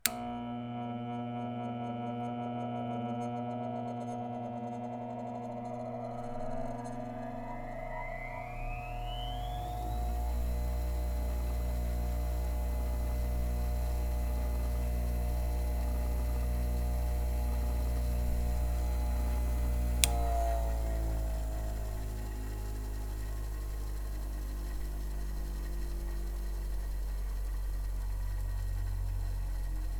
Sound effects > Other mechanisms, engines, machines
bench grinder startup maintain and shut off
bam, bang, boom, bop, crackle, foley, fx, knock, little, oneshot, perc, pop, sfx, shop, sound, strike, tink, tools, wood